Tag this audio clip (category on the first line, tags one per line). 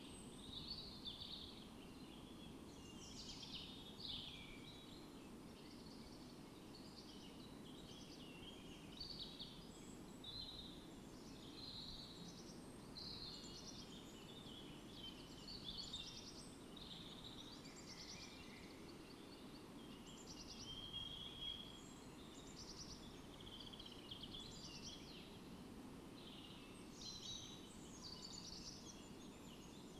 Soundscapes > Nature
sound-installation
field-recording
phenological-recording
weather-data
nature
modified-soundscape
alice-holt-forest
data-to-sound
Dendrophone
artistic-intervention
natural-soundscape
raspberry-pi
soundscape